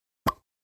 Sound effects > Objects / House appliances
My original old recording for pretty popular so i have made x3 more different versions of opening a magic potion/exilir for an RPG game. See description below a wrote for my orignal recording for what you could do when combining sounds with it... A sound effect of opening a magic potion. The character pops of the the cork lid and then once you add your following sound effects drinking the exilir. Also some bubbling sounds could be cool as well as a magical sound effect added as well. It would be great to be used in an rpg game followed by a drinking/gulping sound then a satisfied arrrgghh! Thats just how i picture it like the good ol' rpgs they used to make. Could be used for anything elese you can think of as well. It only has been edited to remove background noise of either side of clip and also volume was amplified by 5db overall due to a quiet recording. Helps to say if you need to quit down or rise volume you know where the baseline is.